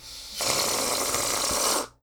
Sound effects > Objects / House appliances
A rubber balloon deflating.